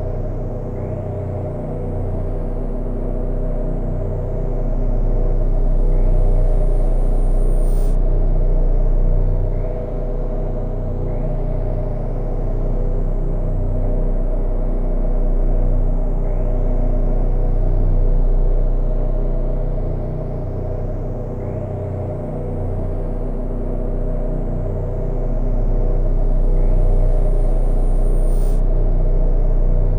Soundscapes > Synthetic / Artificial
Deep Eerie
Made for a video game that never ended up getting released. Eerie, terrifying background sound, made with a Minilogue XD(?) I think? Produced in Pro Tools, made to loop, and then I kinda shelved it for years. There's also the sound of me tossing coins, just for a fun effect
ambiance, background, bg, creepy, haunted, horror, sinister, terrifying, thriller, weird